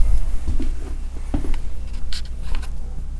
Sound effects > Objects / House appliances
knife and metal beam vibrations clicks dings and sfx-065
Vibration, Metal, Perc, metallic, Wobble, ting, Klang, SFX, Foley, Trippy, Beam, FX, Clang, ding, Vibrate